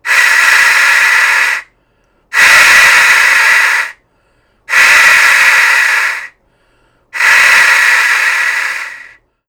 Sound effects > Objects / House appliances
WHSTMisc-Blue Snowball Microphone, CU Acme Windmaster, Jay Whistle Nicholas Judy TDC
An acme windmaster imitating a jay whistle.
acme-windmaster, Blue-brand, Blue-Snowball, imitation, jay, whistle